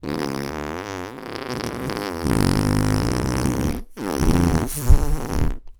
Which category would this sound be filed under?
Sound effects > Human sounds and actions